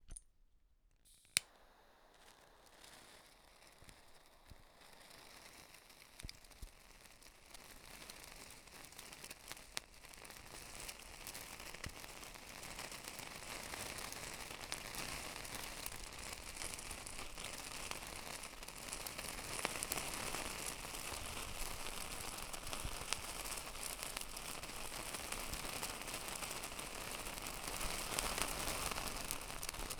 Sound effects > Natural elements and explosions
start of smoking shish
tobacco, smoking, shisha